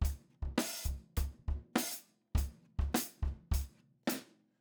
Music > Solo percussion
Short loop 104 BPM in 4
drums, kit, live, loop, recording, studio